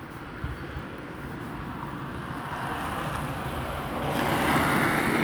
Soundscapes > Urban
voice 13 14-11-2025 car
Car, vehicle, CarInTampere